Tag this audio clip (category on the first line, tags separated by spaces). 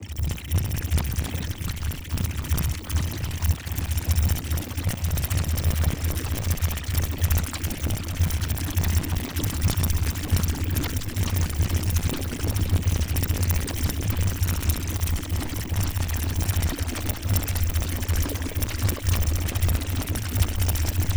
Sound effects > Electronic / Design
Glitch Fluid Texture